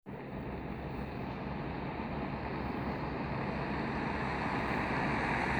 Soundscapes > Urban
voice 14 14-11-2025 car
Car, CarInTampere, vehicle